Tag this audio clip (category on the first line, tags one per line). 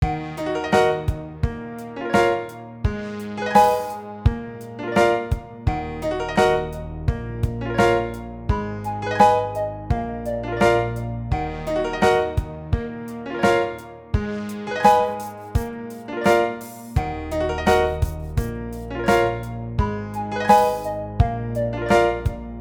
Music > Multiple instruments
85bpm
Acoustic
Bass
Beat
Drum
EbMinor
Game
Hip
Hop
Instrumental
Keyboard
Keys
Layered
Loop
Music
Piano
Sample
Snare
Synth
Video